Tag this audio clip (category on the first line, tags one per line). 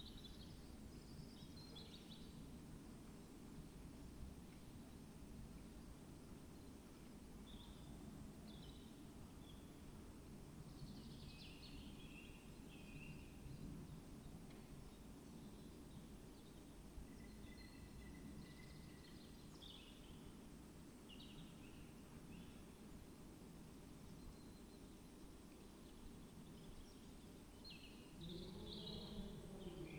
Soundscapes > Nature
Dendrophone
weather-data
alice-holt-forest
phenological-recording
data-to-sound
nature
sound-installation
modified-soundscape
raspberry-pi
field-recording
soundscape
artistic-intervention
natural-soundscape